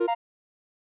Sound effects > Electronic / Design
Notification sound make with 2 chords, made for notifications where a user got a new message (ex.: private message, chatroom reply).